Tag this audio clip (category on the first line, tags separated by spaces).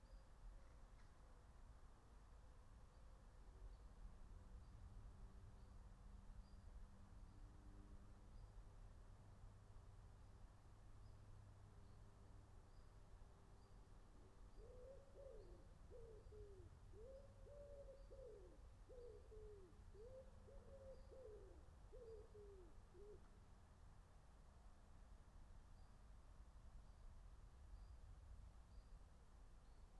Soundscapes > Nature
soundscape nature natural-soundscape meadow alice-holt-forest raspberry-pi phenological-recording field-recording